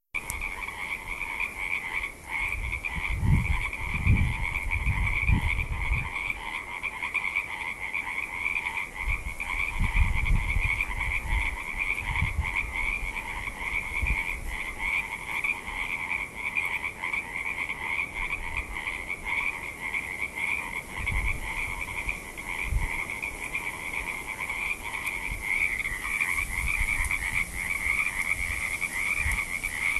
Sound effects > Animals
A bunch of frogs croaking in a pond on a windy afternoon day. Recorded with a Pixel 4A phone.
croak, croaking, frog, frogs, pond